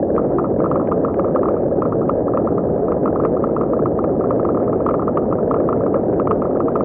Sound effects > Objects / House appliances
Boiling Water5(Pink Noise Paded)
Hi ! That's not recording sound :) I synth it with phasephant!